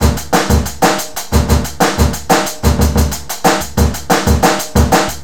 Music > Other
drum break 183 bpm
Fl studio 9 + vst slicex worksampling
garbage, beat, percs, drums, break, drumbeat, drumloop, breakbeat, quantized, percussion-loop, groovy